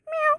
Sound effects > Animals
Cat Meow #1
Cat sound I made for a videogame, shout out to my girlfriend for voicing it. Pitch shifted a little.
kitten, meow, cat